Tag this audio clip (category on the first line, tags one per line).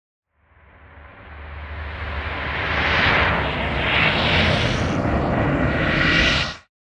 Sound effects > Electronic / Design
cinematic
drama
effects
horror
movie
noise
sci-fi
SFX
sounddesign
suspense
tension
title
transition